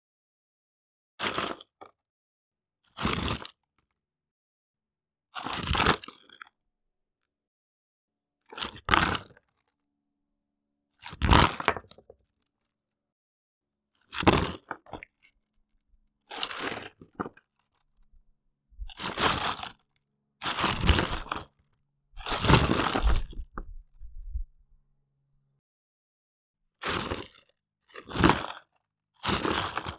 Sound effects > Natural elements and explosions
sounds of rock movements from a plant tray. emulating someone scraping on the ground, dragging objects or rock falling sounds.